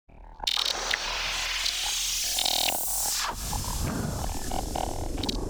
Sound effects > Electronic / Design
Clitch Clik Glitttch
Trippin, Drone, Experimental, Creature, Neurosis, Trippy, Buzz, Noise, Alien, Creatures, Droid, Synthesis, Mechanical, Glitch, FX, Abstract, Digital, Spacey, Robotic, Analog, Otherworldly, Automata